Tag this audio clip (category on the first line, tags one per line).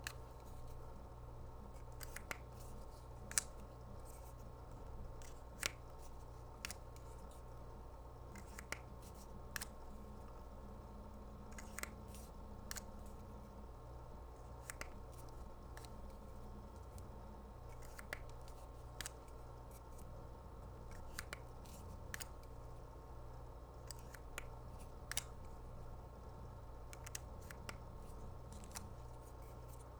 Sound effects > Objects / House appliances
Blue-brand
Blue-Snowball
close
foley
open
permanent-marker
top